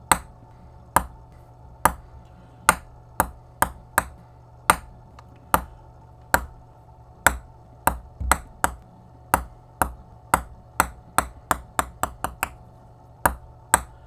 Sound effects > Objects / House appliances
Single ping pong ball hits after serving.